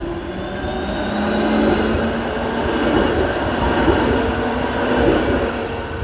Sound effects > Vehicles
tram-samsung-13
tram, outside, tramway, vehicle